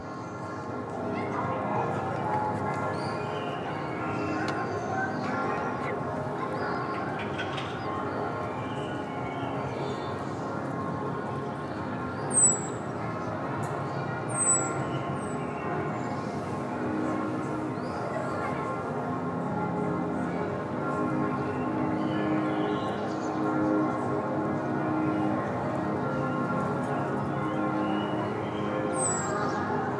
Soundscapes > Urban
Switzerland Bern church bells children birds
The church bells of Bern, with sounds of nearby children playing, birds singing, and occasional traffic. Recorded 26 April 2025 with Clippy EM272s.
bells, traffic, city, people, street, field-recording, children